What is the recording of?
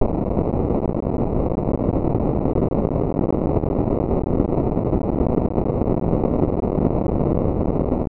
Sound effects > Electronic / Design
Dark low frequency electronic noise Created with SynthMaster for iPad